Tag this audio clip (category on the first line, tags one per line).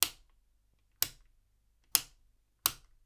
Sound effects > Objects / House appliances
click
switches